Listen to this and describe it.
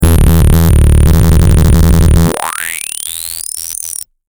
Sound effects > Experimental
Bed Bass
bass, synth